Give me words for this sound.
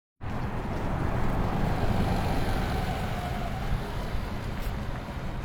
Sound effects > Vehicles
A bus passes by
bus, Passing, bus-stop